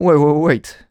Speech > Solo speech
Fear - wawaWait
wait, dialogue, Male, voice, Video-game, NPC, scared, Vocal, FR-AV2, Human, Voice-acting, Tascam, oneshot, Neumann, singletake, Single-take, fear, Man, talk, U67, Mid-20s